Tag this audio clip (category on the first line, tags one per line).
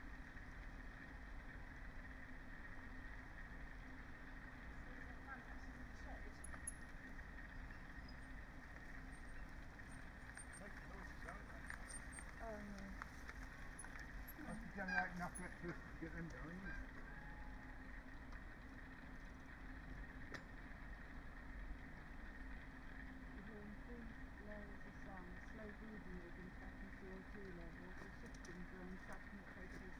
Soundscapes > Nature
alice-holt-forest
artistic-intervention
Dendrophone
field-recording
nature
phenological-recording
soundscape